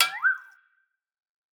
Instrument samples > Percussion
Saunatone Perc 02B (Improvised Flexatone)
diy, flexatone, jungle, liquiddnb, perc, percussion